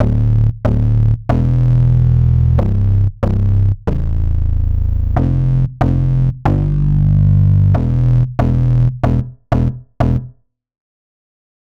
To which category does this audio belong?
Music > Solo instrument